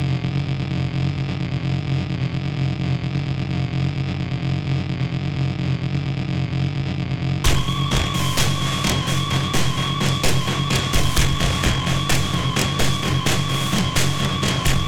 Music > Multiple instruments
drone alarm beat
Overdriven bass tone with bitcrushed drums and wailing alarm noise. Features heavily modified samples from PreSonus loop pack included in Studio One 6 Artist Edition
beat, drone, industrial, noise